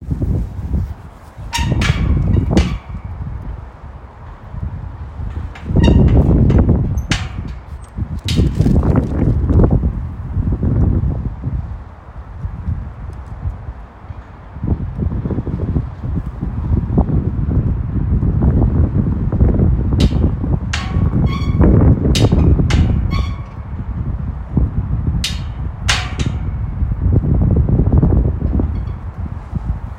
Soundscapes > Urban
windy loose gate
This is a recording of the metal gate of a scrapyard creaking and clattering in high wind. Recorded with an iPhone, this is especially windy due to the, well, wind. No EQ applied.
field-recording, found, ambient, external